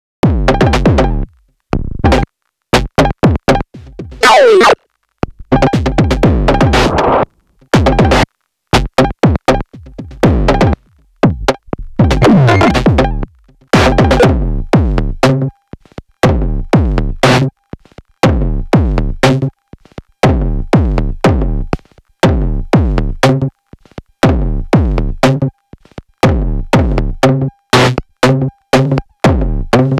Instrument samples > Synths / Electronic
Wizard Peter Morphagene Reel 2
Wizard Peter presents Morphagene Reel 2 for the Make Noise Morphagene Eurorack module
eurorack, morphagene, synth